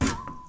Sound effects > Other mechanisms, engines, machines
Handsaw Oneshot Hit Stab Metal Foley 19
foley, fx, handsaw, hit, household, metal, metallic, perc, percussion, plank, saw, sfx, shop, smack, tool, twang, twangy, vibe, vibration